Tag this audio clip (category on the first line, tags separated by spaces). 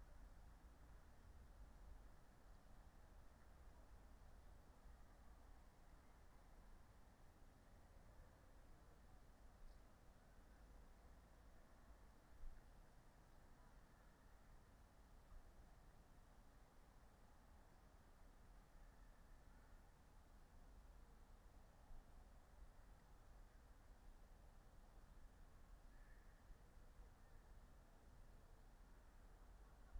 Soundscapes > Nature
Dendrophone nature alice-holt-forest natural-soundscape artistic-intervention data-to-sound modified-soundscape raspberry-pi field-recording soundscape weather-data sound-installation phenological-recording